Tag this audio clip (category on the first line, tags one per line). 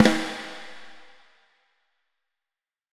Music > Solo percussion
ludwig
snareroll
rimshot
processed
snaredrum
roll
drum
fx
snare
hit
oneshot
rim
sfx
snares
beat
crack
drumkit
kit
brass
realdrums
drums
reverb
perc
acoustic
percussion
flam
realdrum
hits
rimshots